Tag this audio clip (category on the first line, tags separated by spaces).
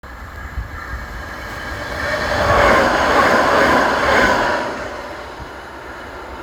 Soundscapes > Urban
Field-recording Railway Tram